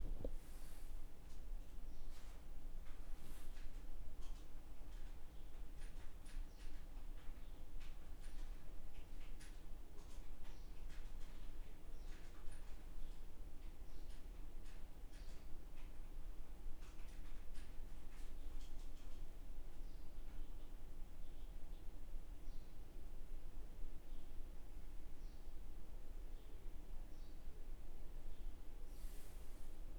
Soundscapes > Indoors
Kitchen Soundscape
The sound of my house's kitchen in silence. You can hear the hum of the fridge and my roommate typing in his room. Recorded with the integrated microphones on a Zoom H5, stereo settings.